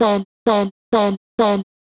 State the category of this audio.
Speech > Solo speech